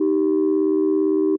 Instrument samples > Synths / Electronic
Landline Phonelike Synth D#5
Holding-Tone, JI, JI-3rd, JI-Third, just-minor-3rd, just-minor-third, Landline, Landline-Holding-Tone, Landline-Phone, Landline-Phonelike-Synth, Landline-Telephone, Landline-Telephone-like-Sound, Old-School-Telephone, Synth, Tone-Plus-386c